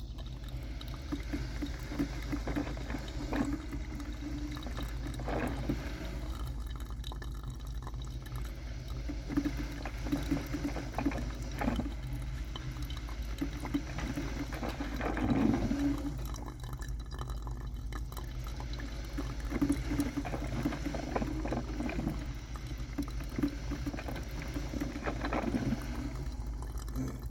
Sound effects > Objects / House appliances
MACHAppl-Samsung Galaxy Smartphone, MCU Coffee Percolator, Working, Looped Nicholas Judy TDC
A coffee percolator working. Looped.
gurgle, trickle